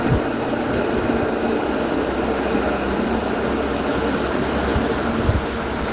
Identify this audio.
Sound effects > Vehicles
Tram passing by at a steady speed in an urban environment. Recorded from an elevated position near the tram tracks, using the default device microphone of a Samsung Galaxy S20+. TRAM: ForCity Smart Artic X34
tram, transport, urban